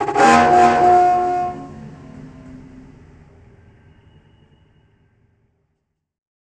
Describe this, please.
Sound effects > Objects / House appliances
shot-metalscrape-02
Scraping and bowing metal sheets
scrape, metal, eerie, banging